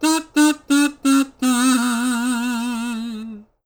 Music > Solo instrument
MUSCInst-Blue Snowball Microphone, CU Kazoo, 'Failure' Accent 04 Nicholas Judy TDC
A kazoo 'failure' accent.
accent, Blue-brand, Blue-Snowball, cartoon, failure, kazoo